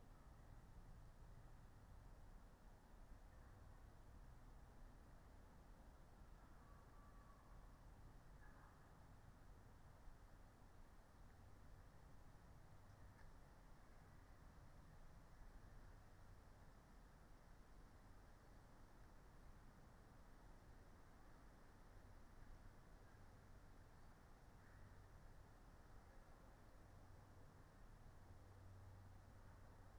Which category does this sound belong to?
Soundscapes > Nature